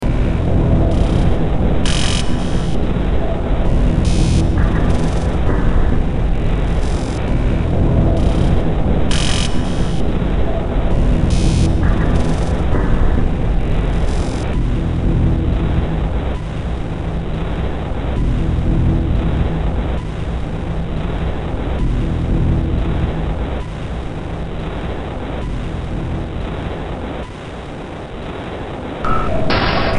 Music > Multiple instruments
Demo Track #3709 (Industraumatic)
Ambient, Cyberpunk, Games, Horror, Industrial, Noise, Sci-fi, Soundtrack, Underground